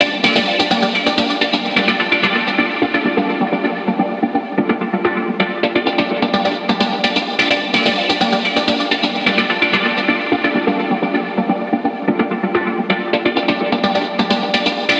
Instrument samples > Synths / Electronic
Techno Synth Chords 001
128bpm, chords, loop, synth, techno